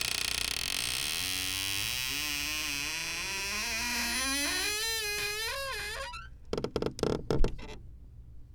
Sound effects > Objects / House appliances
Old Wooden Door Creaking
Old Wooden Door from a closet. Creaking very slow.